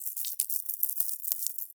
Sound effects > Objects / House appliances
Chains Rattle 1 Texture
Rattling jewellery chains and necklaces in various thicknesses, recorded with an AKG C414 XLII microphone.
Jewellery, Chain, Necklace